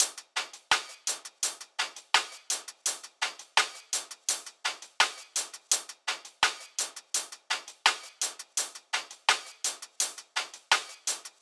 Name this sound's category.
Music > Solo percussion